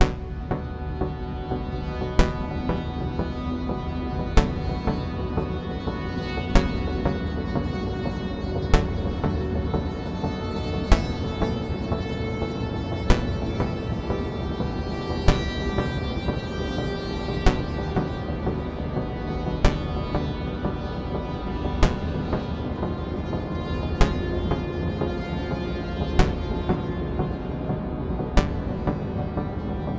Music > Multiple instruments
Timeless Ambient Pad Progression 110bpm
A chill spacey washy ambient pad chord progression i created with various synthesizers
amb, electro, loop, pad